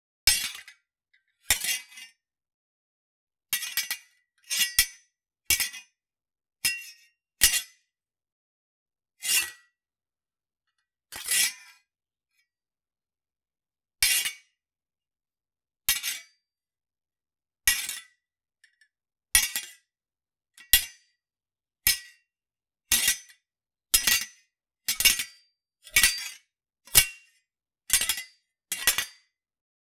Sound effects > Objects / House appliances
collection of custom fencing sword like sounds inspired by tmnt 2012 or from hollywood edge. recorded from silver cleaver knife hitting various knives but lightily on the far end of the cleaver knife.